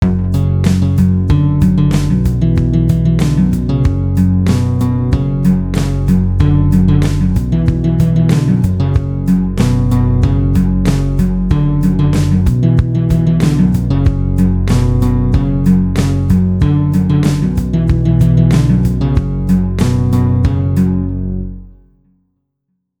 Music > Multiple instruments
Guitar Synth loop
An acoustic guitar and synth bass loop with acoustic drums and quiet strings. Works well for survival video games or and abandoned place in your game. 4/4 time signature. 90 bpm, in Eb minor, not AI. Comment why you're using it, I'm interested